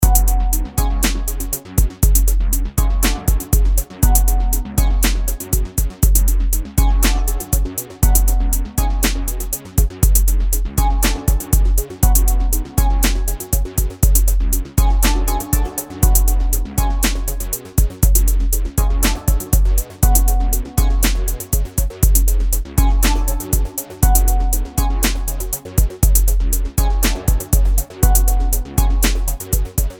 Music > Multiple instruments
Simple Beat with some arp and synths + 808 120BPM
Made in FL11, not sure, old idea i made while trying stuff out like the rest of these.
808, idea, beat, loop, synths, 120, arp